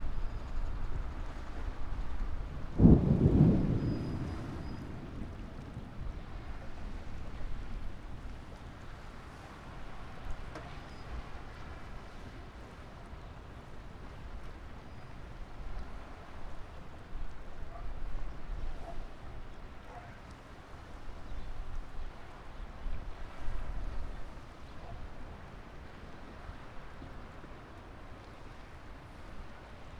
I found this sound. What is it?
Soundscapes > Nature
Storm over the harbour pt.1
Soundscape recorded at the village of Santa Maria Salina on the island of Salina. Ominous clouds loom above and cover the island's volcanoes. Thunder and strong wind are the protagonists of the recording along with the crashing of the waves on the breakwater. Sounds of cars and motorbikes can also be heard in the distance. A few turtle doves in the distance. The wind is so strong that the windscreen is not enough to protect the capsules from creating bumbs and clips (removed from the recording with as conservative an editing as possible) Recorded date: 18/06/2025 at 14:19 with: Zoom H1n with windscreen. Processing: no processing
ambience, field-recording, freesound20, italy, nature, outdoor, sea, storm, summer, thunder, waves, wind